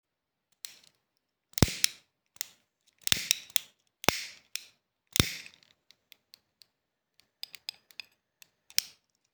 Sound effects > Objects / House appliances
a disposable lighter being ignited a few times, with clear sound and noticeable "spark" noise made my the ignition. the flame is low and crackling.
clipper, collection, flint, ignite